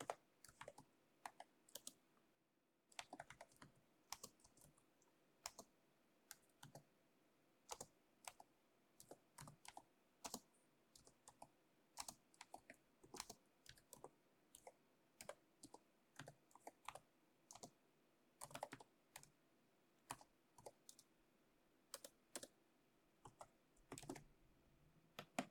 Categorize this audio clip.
Sound effects > Other mechanisms, engines, machines